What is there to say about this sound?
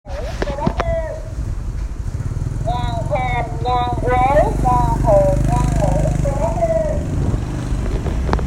Speech > Solo speech
Man sell food say 'Rau thơm, rau rỗ, rau thương, ... cô bác ơi'. Record use iPhone 7 Plus smart phone 2025.11.21 07:35